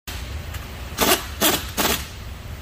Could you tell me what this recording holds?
Sound effects > Other mechanisms, engines, machines
Air Tool SFX
This sound captures the low-pitch motor of an air tool impact wrench as it is being used to tighten bolts. Like tightening the lug nut bolts on a tire.